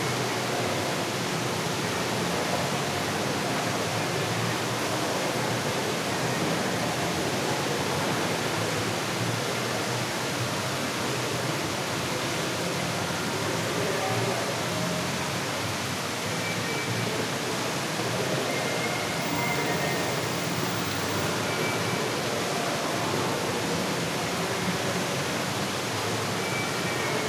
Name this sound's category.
Soundscapes > Indoors